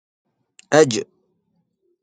Sound effects > Other
jim-sisme
arabic, male, sound, vocal, voice